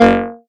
Instrument samples > Synths / Electronic
TAXXONLEAD 2 Bb
fm-synthesis, bass, additive-synthesis